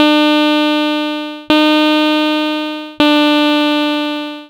Sound effects > Electronic / Design
alarm sound i made in audacity
emergency, alarm, warning, alert